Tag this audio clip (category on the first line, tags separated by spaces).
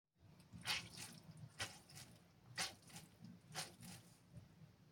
Sound effects > Human sounds and actions
background-sound general-noise soundscape